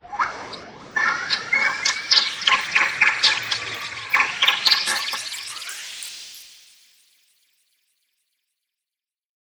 Sound effects > Experimental
Bird experimental Dare2025-05
A experimental bird sound editing. Gated by the following sound. Distorted and reverbed with automated delay/warp. Filtered in all the mid/lows out, it also ducks the very high end of the two over tracks. Made for Dare2025_05, record or modify a bird sound.